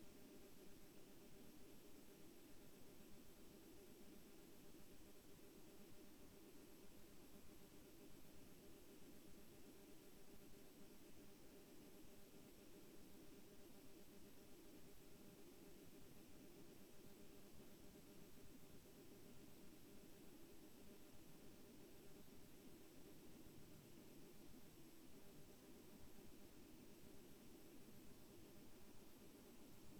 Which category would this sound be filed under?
Soundscapes > Nature